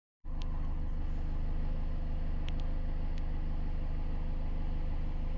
Sound effects > Other mechanisms, engines, machines
clip auto (23)
Avensis, Auto, Toyota